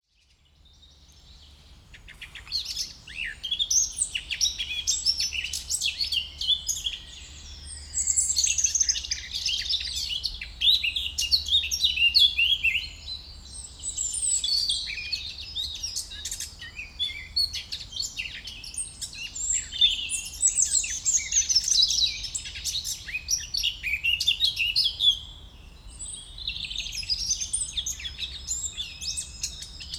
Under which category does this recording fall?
Soundscapes > Nature